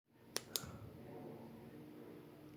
Sound effects > Objects / House appliances
a flashligth i recorded with my phone and a flashlight
effect, fx, sfx, sound, soundeffect